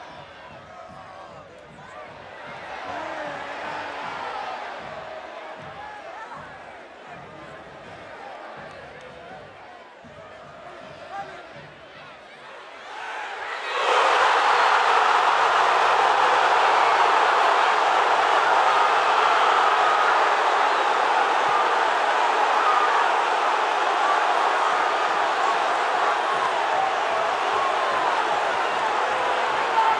Soundscapes > Urban
CLUB ATLETICO BELGRANO - CAB - GOL
CLUB ATLETICO BELGRANO VS BOCA. FECHA 12/04/2025. GOL. GRABADO CON RODE NT5 EN CONFIGURACION ORTF Y SOUNDDEVICES MIXPRE3 -------------------------------------------------------------------------------------------------------------- Club Atletico Belgrano vs. Boca Juniors. Date: April 12, 2025. Goal scored. Recorded with a Rode NT5 in the Ortf configuration and SoundDevices MixPre3.
ALBERDI, CAB, CELESTE, CLUB-ATLETICO-BELGRANO, CORDOBA, GOAL, GOL, PIRATAS, PIRATE, PIRATE-OF-ALBERDI, SOCCER, STADIUM